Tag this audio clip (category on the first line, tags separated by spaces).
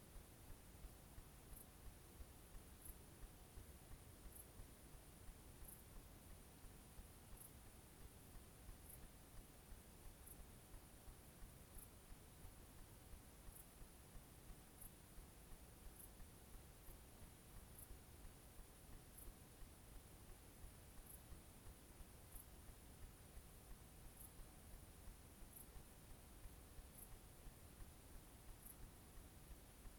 Soundscapes > Nature
H2n ambience valley ambiance forest combe 21410 France night nature thunder country-side Zoom XY Tascam rural Cote-dor field-recording Gergueil